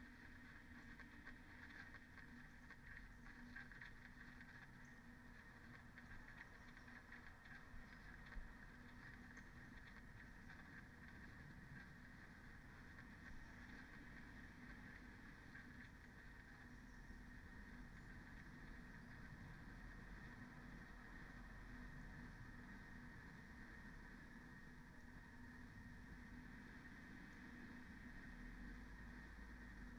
Soundscapes > Nature
Dendrophone is a site-specific sound installation by Peter Batchelor located in Alice Holt Forest, Surrey, UK, that transforms local environmental data into immersive sound textures. These recordings are made directly from the installation’s multichannel output and capture both its generative soundscape and the ambient natural environment. The sounds respond in real-time to three key ecological variables: • Humidity – represented sonically by dry, crackling textures or damp, flowing ones depending on forest moisture levels. • Sunlight energy – conveyed through shifting hissing sounds, juddery when photosynthetic activity is high, smoother when it's low. • Carbon dioxide levels – expressed through breathing-like sounds: long and steady when uptake is high, shorter and erratic when it's reduced. The installation runs on a DIY multichannel system based on Raspberry Pi Zero microcomputers and low-energy amplifiers.
alice-holt-forest, artistic-intervention, data-to-sound, Dendrophone, field-recording, modified-soundscape, natural-soundscape, nature, phenological-recording, raspberry-pi, sound-installation, soundscape, weather-data